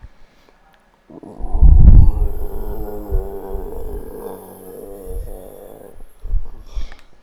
Sound effects > Animals
A monster growling.
Creature, Growling, Monster